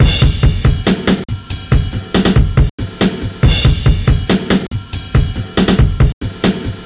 Music > Other
breakbeat 15 drums 140 bpm
FL studio 9 + vst slicex
break, drumbeat, beats, drums, drumloop, breakbeats, loop, drum